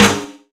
Instrument samples > Percussion
snare PJ Cohen 1
An unrealistic flanger-snare. I initially boosted very slightly a mid-high frequency spectral region. I applied 4 mild (not total cuts) notch filters at the high frequency region; DO NOT REMOVE THE INTERESTING SOUNDS, cut with a little offset (apply offset cut) = with a puny distance from a sound that is interesting. Then I mildly lowered the mids and the bass frequencies progressively more and more after the 200 ms.